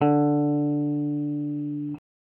Instrument samples > String
Random guitar notes 001 D3 01
electric, stratocaster, electricguitar, guitar